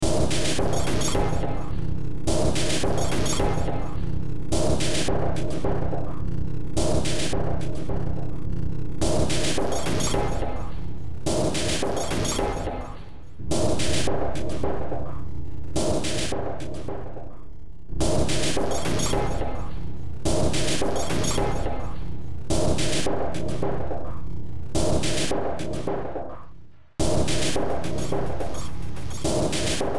Music > Multiple instruments
Short Track #3975 (Industraumatic)
Cyberpunk, Games, Industrial, Noise, Soundtrack